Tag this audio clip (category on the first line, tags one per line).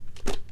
Sound effects > Objects / House appliances
click
industrial
foley
plastic